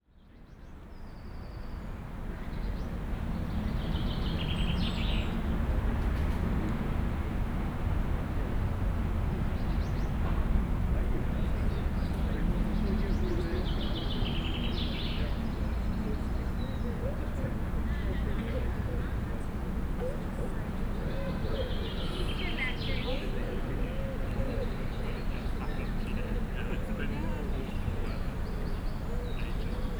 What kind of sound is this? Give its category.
Soundscapes > Nature